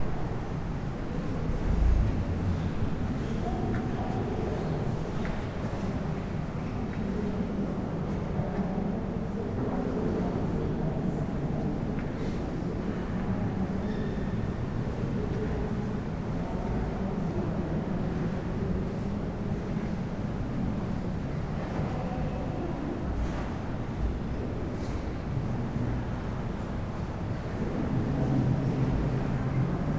Soundscapes > Indoors
Large Church Nave Ambience - Hallgrimskirkja
Sat inside Hallgrimskirkja in downtown Reykjavik for a while recording with my Tascam X6. There's shuffling, clatter, and walla with a lot of reverberation since the nave is huge with good acoustics. Some gentle compression and limiting applied.
cathedral, clamor, clatter, cough, creak, murmur, people, portacapture, resonance, reykjavik, rustle, shuffling, tascam, voices, x6